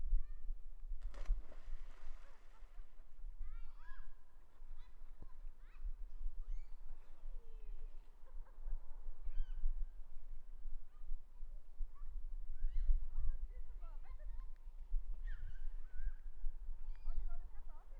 Soundscapes > Nature
children playing in the lake
children in the lake playing
children, field-recording, river, water